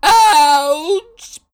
Speech > Solo speech
VOXScrm-Blue Snowball Microphone, CU 'Ouch!' Nicholas Judy TDC
A voice yelling 'Ouch!'.
ouch, Blue-Snowball, yell, Blue-brand, male